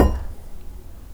Sound effects > Other mechanisms, engines, machines
metal shop foley -043
strike, foley, sfx, bang, sound, percussion, shop, metal, fx, thud, knock, tools, bop, rustle, pop, boom, crackle, wood, tink